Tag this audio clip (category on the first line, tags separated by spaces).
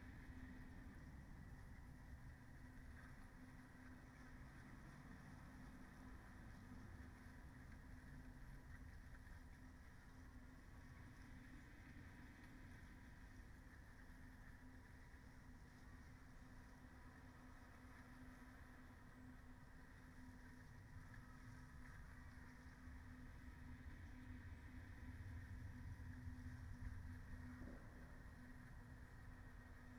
Soundscapes > Nature
data-to-sound Dendrophone soundscape nature modified-soundscape